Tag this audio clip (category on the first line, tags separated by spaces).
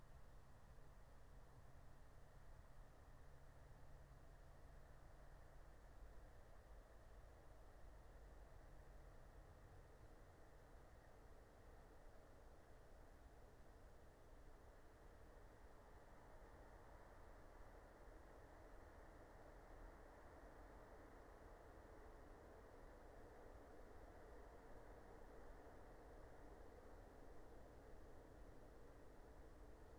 Soundscapes > Nature
alice-holt-forest meadow natural-soundscape nature phenological-recording raspberry-pi soundscape